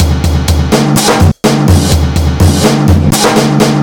Music > Other
old drum 125 bpm loop
FL studio 9. vst slicex découpe du beat
beat, break, breakbeat, drumbeat, drumloop, drums, loop, percussion